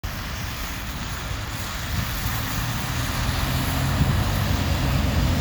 Soundscapes > Urban
A bus passing the recorder in a roundabout. The sound of the bus engine can be heard along with sound of rain. Recorded on a Samsung Galaxy A54 5G. The recording was made during a windy and rainy afternoon in Tampere.
passing, bus, rain